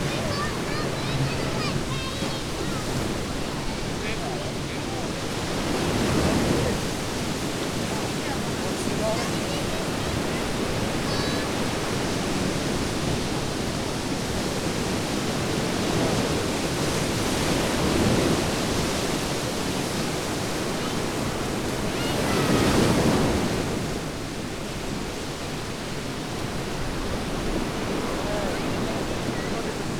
Soundscapes > Urban
AMBSea Beach with waves, birds, nearby walla, passing helicopter 6PM QCF Gulf Shores Alabama Zoom H1n
A beach in late afternoon, summer. Orange Beach, Alabama. Waves, wind, a passing helicopter. Nearby walla of adults and kids playing and swimming
wind, helicopter, beach, waves, kids-playing